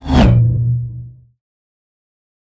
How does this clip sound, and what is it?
Sound effects > Other
Sound Design Elements Whoosh SFX 012
ambient, sweeping, swoosh, element, production, elements, movement, fast, trailer, motion, film, sound, dynamic, design, fx, audio, transition, whoosh, cinematic, effect, effects